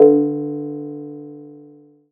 Instrument samples > Piano / Keyboard instruments
Phonk cowbell 3 B key
Synthed with phaseplant only. Processed with Plasma. Play it in C4-G5 Range, Stretch mode use Resample.